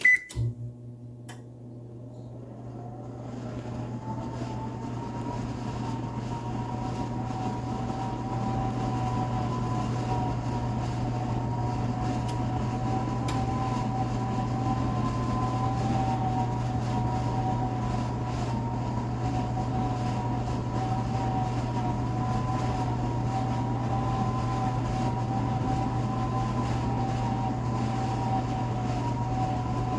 Sound effects > Objects / House appliances
MACHAppl-Samsung Galaxy Smartphone, MCU Oven, Beep, Start, Run, Stop Nicholas Judy TDC
An oven beeping, starting, running and stopping.
oven, Phone-recording, start, stop